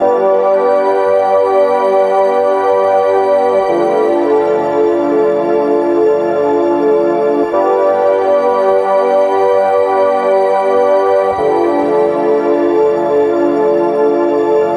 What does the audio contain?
Music > Solo instrument
130 C KorgPoly800 Loop 01
Synth Melody made using Korg Poly-800 analog synth
80s, Analog, Analogue, Loop, Music, MusicLoop, Rare, Retro, Synth, SynthLoop, SynthPad, Texture, Vintage